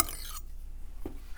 Sound effects > Objects / House appliances
knife and metal beam vibrations clicks dings and sfx-059
Beam, Clang, ding, Foley, FX, Klang, Metal, metallic, Perc, SFX, ting, Trippy, Vibrate, Vibration, Wobble